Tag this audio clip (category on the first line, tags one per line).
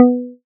Instrument samples > Synths / Electronic
additive-synthesis
fm-synthesis
pluck